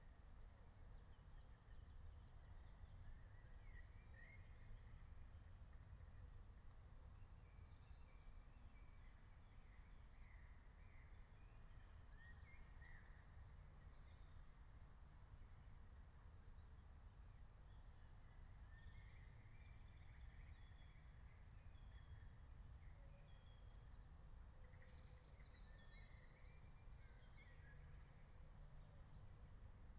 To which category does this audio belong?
Soundscapes > Nature